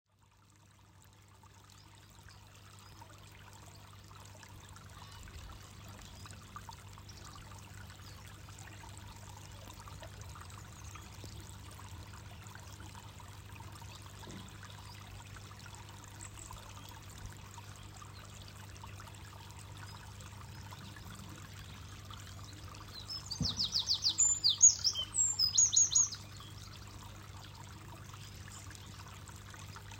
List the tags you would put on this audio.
Soundscapes > Nature
ambient
birdsong
countryside
field-recording
nature
river
spring

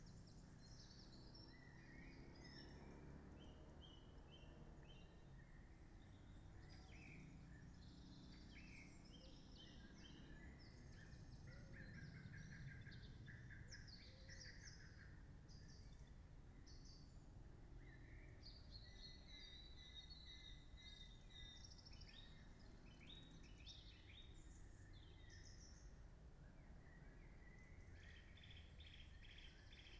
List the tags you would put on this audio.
Soundscapes > Nature
field-recording
Dendrophone
sound-installation
soundscape
phenological-recording
alice-holt-forest
nature
artistic-intervention
modified-soundscape
natural-soundscape
data-to-sound
weather-data
raspberry-pi

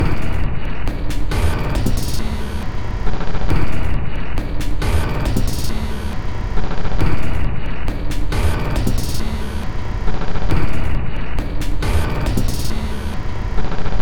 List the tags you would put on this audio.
Instrument samples > Percussion
Soundtrack
Loopable
Dark
Weird
Industrial
Alien
Packs
Drum
Samples
Loop
Underground
Ambient

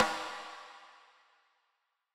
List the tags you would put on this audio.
Music > Solo percussion
kit
ludwig
reverb
sfx
roll
processed
acoustic
crack

